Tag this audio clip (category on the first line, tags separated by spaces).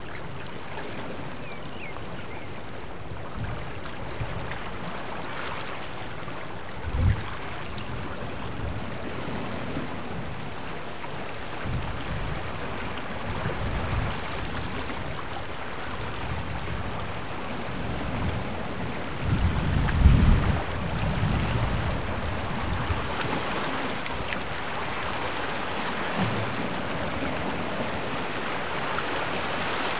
Soundscapes > Nature
waves britanny sea port ocean ushant